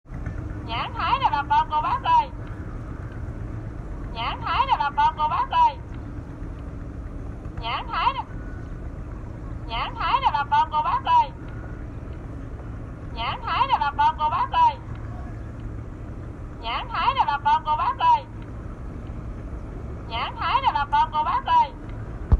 Speech > Solo speech
Nhẵn Thái Bà Con Cô Bác Ơi
Woman sell fruit. Record use iPhone 7 Plus 2024.12.04 07:56.
business, fruit, viet, voice